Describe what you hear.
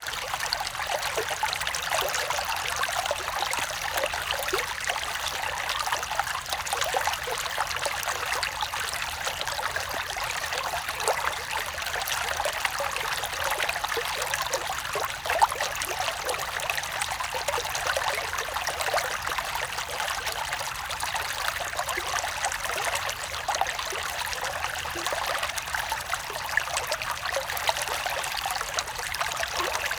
Soundscapes > Nature
r17 babbling brook
Field recording. Babbling brook. Recorded April 7th, 2025 on iPhone 11 version 16.2 with Voice Memos application. No additional microphones, mufflers or hardware used in recording. Pure sound, pure nature. No edits. Feint bird call nearing the end.